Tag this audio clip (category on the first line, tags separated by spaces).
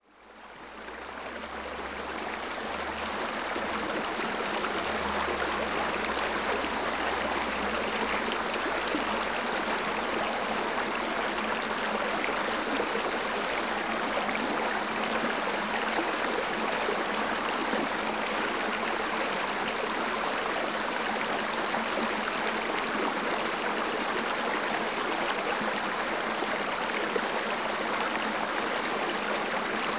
Sound effects > Natural elements and explosions
brook filed recording river stream water